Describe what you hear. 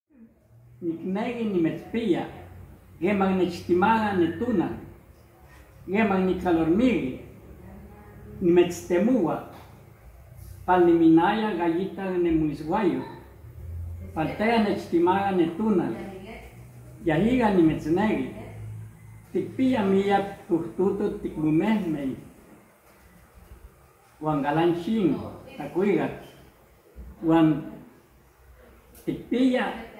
Speech > Solo speech
Poeta recitando en Nahuat El Salvador
Vocal sound of a Nahuat poet reciting both in nahuat and Spanish. Recorded in the culture house of Santo Domingo de Guzman / Witzapan, El Salvador.
Voice, domingo, central, de, santo, america, recording, el, field, nahuat, indigenous, guzman, salvador